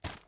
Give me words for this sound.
Sound effects > Objects / House appliances
Sound used originally for the action of putting a paper bag down. Recorded on a Zoom H1n & Edited on Logic Pro.